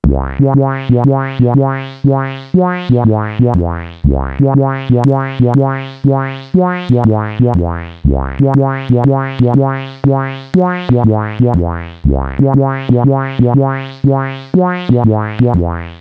Instrument samples > Synths / Electronic
Short clip called Why Walking
ambient, music, loop, techno, synth, electronic, electro, silly